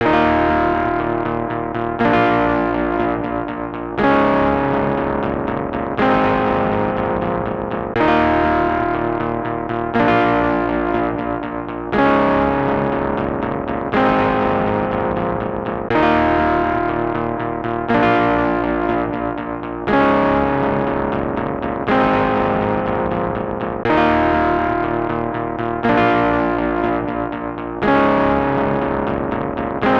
Music > Solo instrument
Guitar loops 114 09 verison 09 60.4 bpm
Elilectric guitar distorded . The VST plugin cybercore drive was used for the fuzz effect This sound can be combined with other sounds in the pack. Otherwise, it is well usable up to 4/4 60.4 bpm.